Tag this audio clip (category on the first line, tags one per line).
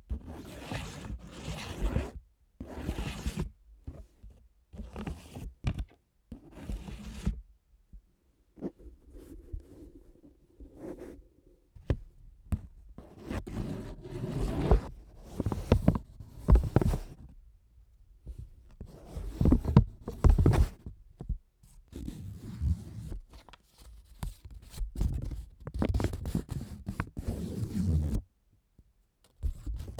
Sound effects > Objects / House appliances
bill
H5
note
XY
Zoom-H5